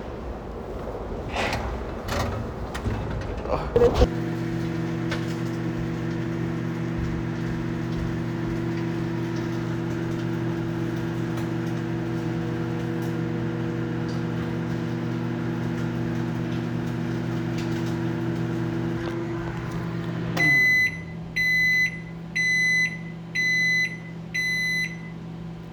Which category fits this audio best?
Sound effects > Other mechanisms, engines, machines